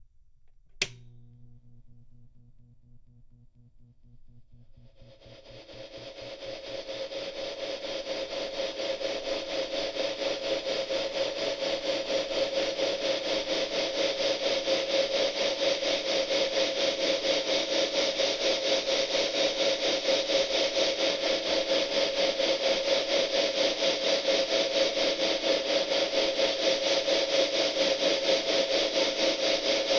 Instrument samples > Other
Vox AC-15 Amp Noise
Vox AC-15 amp with tremolo and reverb, run through four pedals: Red Panda Particle, Electro-Harmonix Satisfaction (modded for more harmonic distortion), Line 6 Echo Park, and EarthQuaker Avalanche Run.
electric-guitar, tremolo, amp-noise, amp, effects